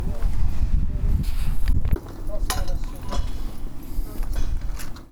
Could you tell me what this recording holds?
Sound effects > Objects / House appliances
Junkyard Foley and FX Percs (Metal, Clanks, Scrapes, Bangs, Scrap, and Machines) 114
Robot, Dump, trash, scrape, Ambience, Atmosphere, garbage, Environment, Metallic, Junkyard, Smash, SFX, dumping, Clang, Clank